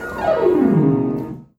Music > Solo instrument
MUSCKeyd-Samsung Galaxy Smartphone, CU Grand Piano, Gliss Down, Low Nicholas Judy TDC
A low grand piano gliss down. Recorded at the Arc.